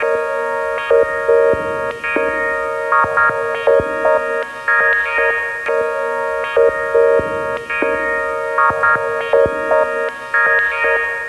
Music > Solo instrument
85 C# KorgPoly800 Loop 01
Analogue, Rare, SynthLoop, Retro, Music, 80s, Texture, Vintage, Analog